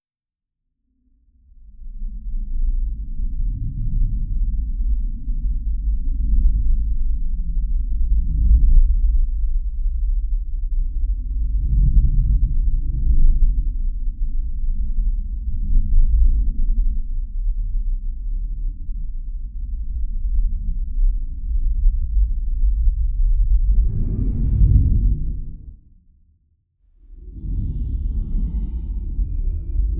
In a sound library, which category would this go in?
Soundscapes > Synthetic / Artificial